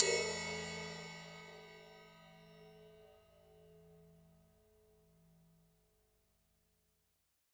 Music > Solo instrument
Cymbal hit with knife-009
Crash, Custom, Cymbal, Cymbals, Drum, Drums, FX, GONG, Hat, Kit, Metal, Oneshot, Paiste, Perc, Percussion, Ride, Sabian